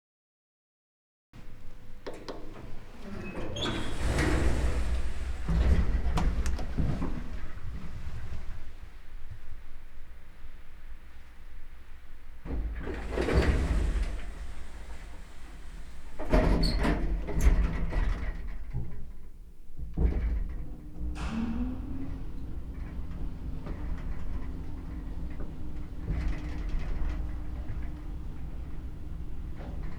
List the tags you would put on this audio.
Sound effects > Other mechanisms, engines, machines
Elevator
inside-elevator

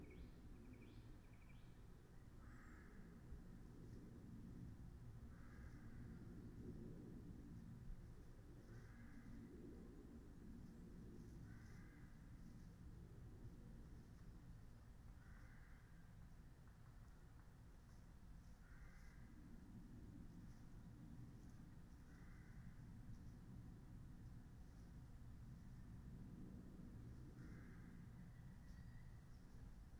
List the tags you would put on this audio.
Soundscapes > Nature
field-recording
Dendrophone
raspberry-pi
phenological-recording
artistic-intervention
alice-holt-forest
data-to-sound
natural-soundscape
soundscape
modified-soundscape
sound-installation
weather-data
nature

